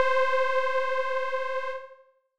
Instrument samples > Synths / Electronic
DX10SDP5 (C)
a synth supersaw pluck sound.
trance, supersaw, pluck, synth